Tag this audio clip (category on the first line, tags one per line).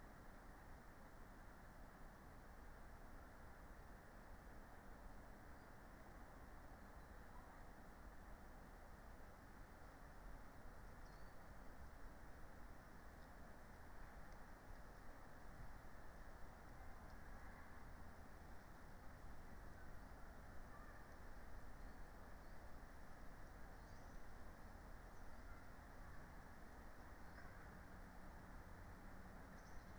Soundscapes > Nature
field-recording
phenological-recording
soundscape
Dendrophone
nature
alice-holt-forest
modified-soundscape
sound-installation
artistic-intervention
data-to-sound
natural-soundscape
raspberry-pi
weather-data